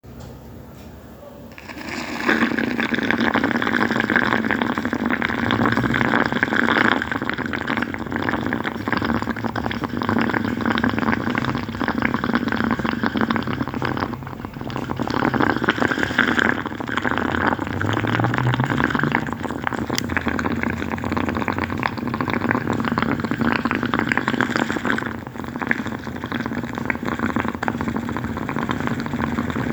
Sound effects > Objects / House appliances
Mate, final sound when drinking Argentine mate...
final sound when drinking Argentine mate Recorded with device: Samsung Galaxy A26 Natural sound, unprocessed.
Mate-tee, Argentina, Mate, Uruguay